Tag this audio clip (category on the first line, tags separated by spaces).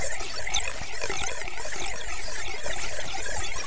Sound effects > Electronic / Design
industrial-noise free sound-design creative noise commons scifi sci-fi royalty